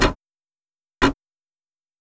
Sound effects > Objects / House appliances
CLOCKTick-Blue Snowball Microphone, CU Large, Alarm, Looped Nicholas Judy TDC
A large alarm clock ticking. Looped.
Blue-brand, alarm, tick, alarm-clock, large, Blue-Snowball, clock, loop